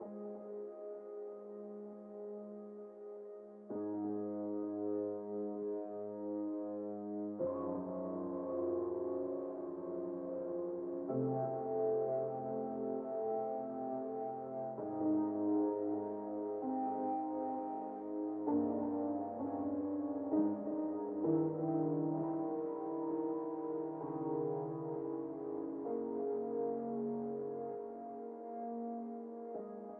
Music > Solo instrument
slow atmospheric springy synth sound made with Image-Line SAKURA